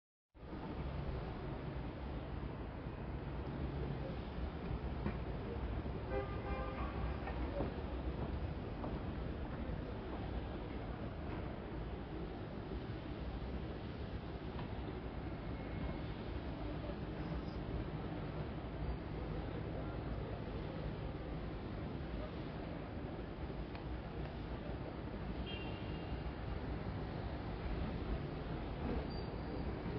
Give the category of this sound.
Soundscapes > Urban